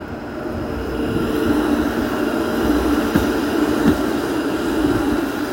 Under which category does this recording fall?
Sound effects > Vehicles